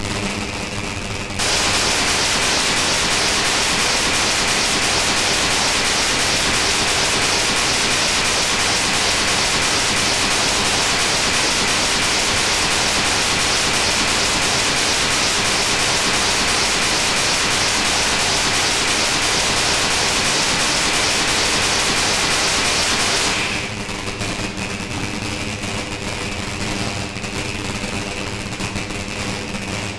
Music > Solo percussion
Simple Bass Drum and Snare Pattern with Weirdness Added 024
Bass-and-Snare, Bass-Drum, Experimental, Experimental-Production, Experiments-on-Drum-Beats, Experiments-on-Drum-Patterns, Four-Over-Four-Pattern, Fun, FX-Drum, FX-Drum-Pattern, FX-Drums, FX-Laden, FX-Laden-Simple-Drum-Pattern, Glitchy, Interesting-Results, Noisy, Silly, Simple-Drum-Pattern, Snare-Drum